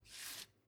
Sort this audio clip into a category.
Sound effects > Other